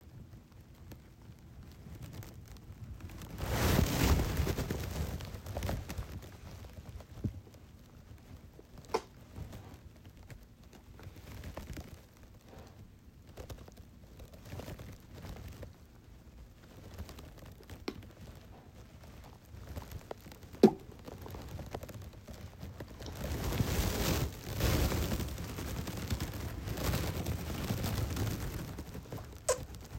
Sound effects > Human sounds and actions
711 N 125th Plaza
Sucking my bf’s best friend off when my bf left for work (huge 9 inch bbc)
Cheating, Oral, orgasm